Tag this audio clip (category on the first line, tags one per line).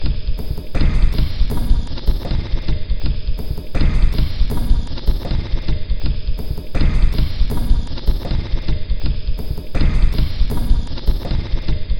Instrument samples > Percussion
Soundtrack
Loop
Packs
Underground
Loopable
Drum
Dark
Alien
Industrial
Samples
Ambient
Weird